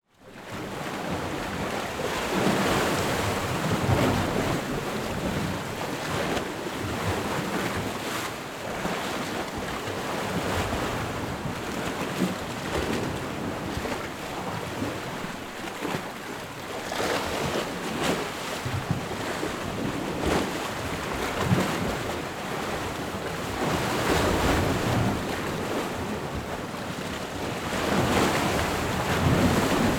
Soundscapes > Nature
Waves Splashing Against Stone Shore
Recorded with Zoom H6 XY-Microphone. Location: Agistri / Greece; places on a stone shore close to the waves splashing against the stones
island, water, shore